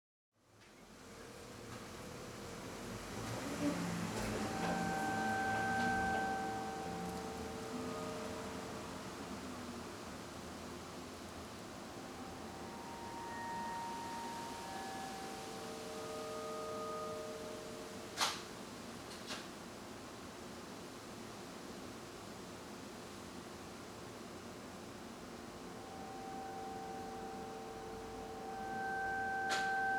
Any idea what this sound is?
Soundscapes > Urban
aeolian harp 20250906 1614
Part of my "Aeolian Harp" pack. An interior domestic soundscape, with an aeolian harp playing in the wind. The aeolian harp sits in a windowsill with the breeze coming in through the window. The aeolian harp is a prototype that I have made. It's constructed of maple plywood and mahogany, and has nylon strings tuned in unison to G3. This has been edited, with various cuts crossfaded due to wind noise that I had not accounted for. Featuring:
wind in trees outside, rustling bushes and leaves
sounds of human activity inside and outside, including shuffling of drawing pencils in a case
metallic chimes being rattled inside
a dog walker, barking dog, pedestrians passing engaged in conversation
a far-off siren
passing cars
Recorder: Sony PCM-D50, 90-degree mic pattern, no wind sleeve.
aeolian, aeolian-harp, wind-harp